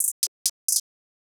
Instrument samples > Percussion
Hihat Roll 01
Trap Sample Packs